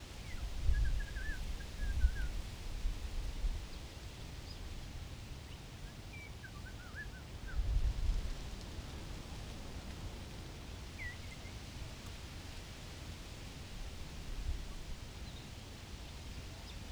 Soundscapes > Nature
Wind in nature
Wind with trees rustling and bird in the background. Recorded with a Rode NTG-3.